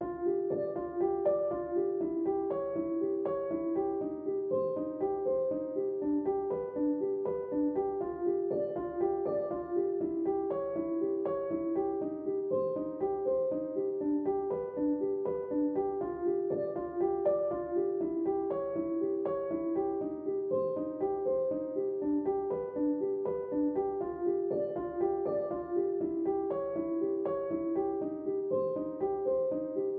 Music > Solo instrument
Piano loops 201 octave down short loop 120 bpm
samples, reverb, piano, simple, pianomusic, simplesamples, 120, music, loop, free, 120bpm